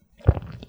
Sound effects > Human sounds and actions
Swallow Gulp Sound
A person swallowing or gulping. Perhaps they are anxious. Perhaps they are eating. You get to decide. Enjoy! Recorded on Zoom H6 and Rode Audio Technica Shotgun Mic.
anxiety, drink, gulp, swallow